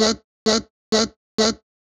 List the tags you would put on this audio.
Speech > Solo speech
Vocal BrazilFunk One-shot

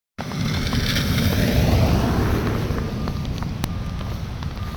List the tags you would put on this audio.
Soundscapes > Urban
Car passing studded tires

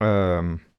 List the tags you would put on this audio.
Sound effects > Human sounds and actions
Mid-20s Neumann U67 oneshot Tascam NPC Human Man dialogue Video-game cringe singletake FR-AV2 Male hesitant unsure Errm Single-take Vocal Voice-acting talk

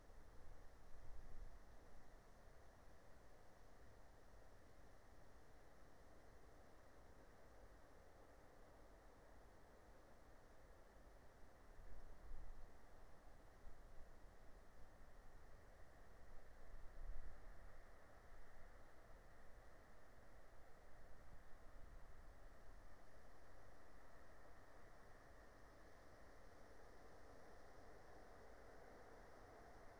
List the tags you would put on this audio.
Soundscapes > Nature
meadow nature phenological-recording raspberry-pi natural-soundscape soundscape field-recording alice-holt-forest

